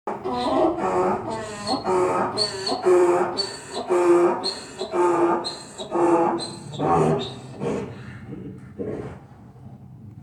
Sound effects > Animals
Donkeys - Donkey Bray, Medium Perspective
A donkey braying whose recording was made by an LG Stylus 2022.
heehaw, equine, bray, donkey, farm, barnyard